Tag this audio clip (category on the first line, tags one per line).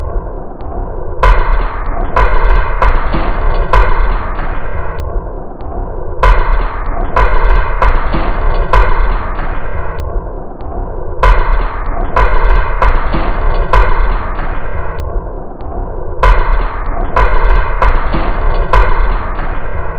Instrument samples > Percussion
Ambient
Drum
Alien
Underground
Dark
Loopable
Weird
Soundtrack
Loop
Samples
Packs
Industrial